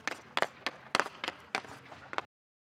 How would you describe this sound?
Sound effects > Other
Ice Hockey Sound Library Stick Handles
Basic, idle stickhandles without embellishments or dangles.
Action, Ice-Hockey